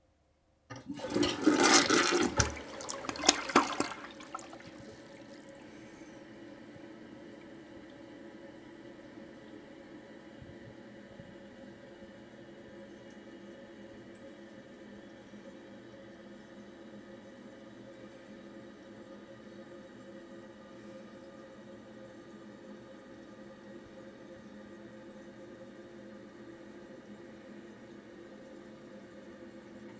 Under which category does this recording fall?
Sound effects > Objects / House appliances